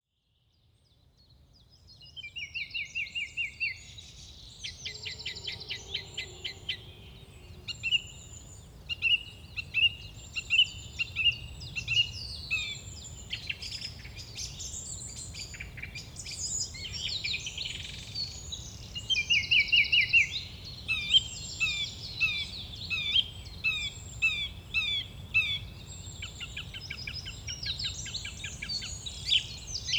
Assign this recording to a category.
Soundscapes > Nature